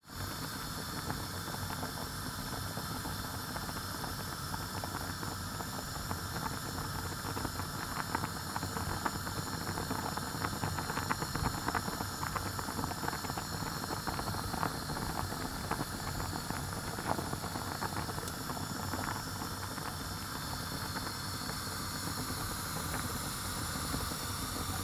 Sound effects > Objects / House appliances
Stove on with pot of boiling water. Recorded with a Zoom H1essential